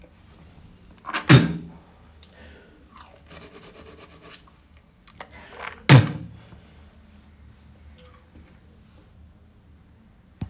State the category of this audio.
Sound effects > Human sounds and actions